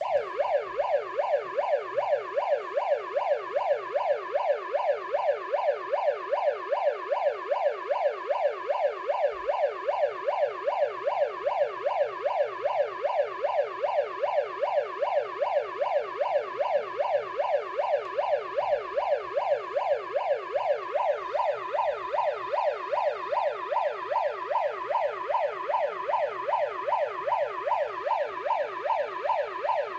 Sound effects > Other
ALRMSirn-Samsung Galaxy Smartphone, MCU Megaphone Nicholas Judy TDC
A megaphone siren.
siren, megaphone, electronic, Phone-recording